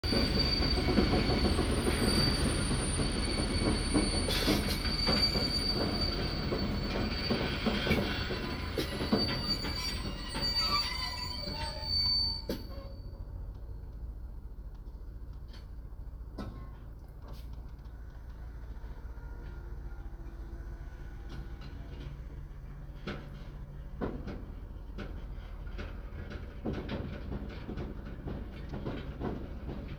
Soundscapes > Other
A simple recording of me waiting for a train to pass in with cargo of logs. You will hear the train stopping for a moment and keeps going after only a few seconds. At the end you hear diesel engine starting of the small truck and the radio starting with the car. I left the whole clip uncut, its recorded with my samsung s20 ultra voice recorder.